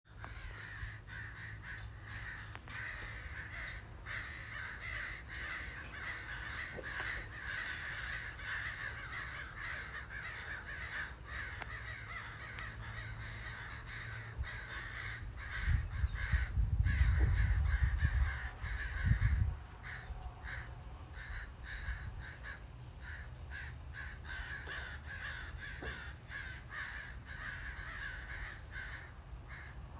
Soundscapes > Nature
Crows screaming at released Great-horn owl 12/06/2024
Crows screaming and attacking released great horn owl
farm, crows